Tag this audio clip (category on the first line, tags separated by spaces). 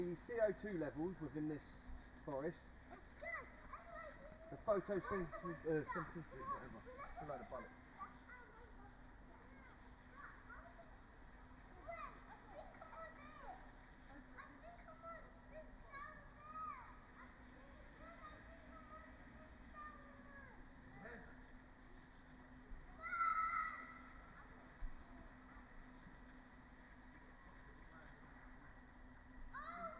Soundscapes > Nature
field-recording weather-data